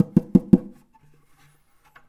Sound effects > Other mechanisms, engines, machines
metal shop foley -217
sfx, rustle, foley, shop, little, thud, perc, bang, tink, percussion, tools, bop, knock, metal, crackle, wood, fx, strike, bam, pop, boom, sound, oneshot